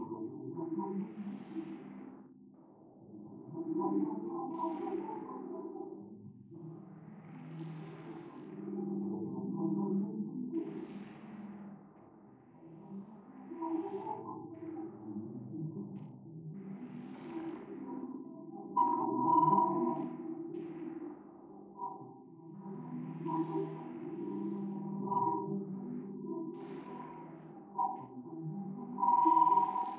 Soundscapes > Synthetic / Artificial
Grainy Textural Ambience with Synth Arp
ambience, synthscape, texture